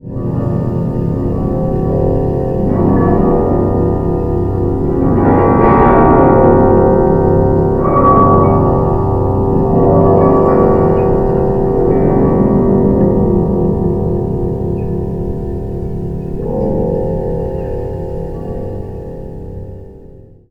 Soundscapes > Other
Text-AeoBert-Pad-05
A homemade elecric aeolian harp set up during a storm in Midlothian Scotland. These are some of the highlights from a 12 hour recording the reflect the violence and strength of the storm we had. The harp was set up on the roof of a shed and bore the brunt of the storm.